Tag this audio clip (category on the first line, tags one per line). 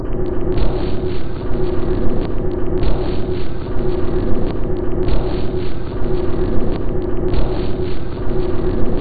Instrument samples > Percussion
Dark
Soundtrack
Samples
Industrial
Ambient
Underground
Loop
Alien
Drum
Weird
Packs
Loopable